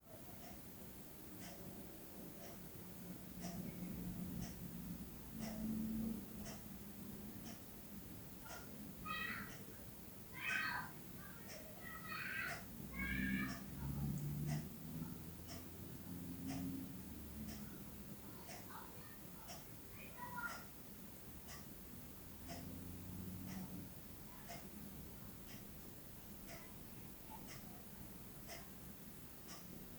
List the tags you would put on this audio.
Soundscapes > Indoors
indoors
living

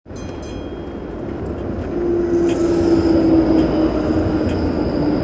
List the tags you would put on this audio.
Sound effects > Vehicles
city
public-transport
tram

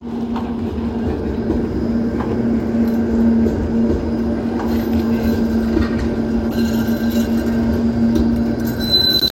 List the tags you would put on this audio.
Sound effects > Vehicles
tram
field-recording
Tampere

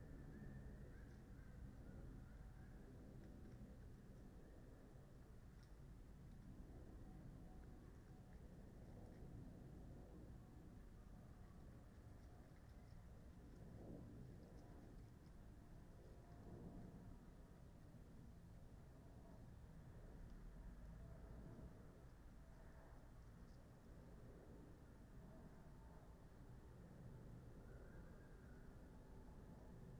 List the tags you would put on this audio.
Soundscapes > Nature
raspberry-pi
data-to-sound
phenological-recording
nature
soundscape
alice-holt-forest
field-recording
Dendrophone
sound-installation
weather-data
natural-soundscape
artistic-intervention
modified-soundscape

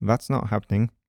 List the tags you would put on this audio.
Speech > Solo speech
july
Male
mid-20s
MKE-600
MKE600
Shotgun-microphone
thats-not-happening
VA

